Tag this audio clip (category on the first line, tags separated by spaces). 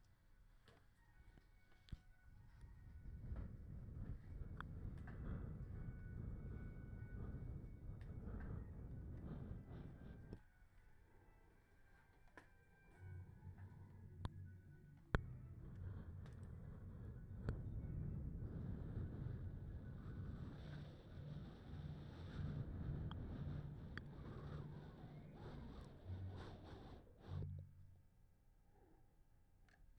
Sound effects > Natural elements and explosions
sounds whitenoise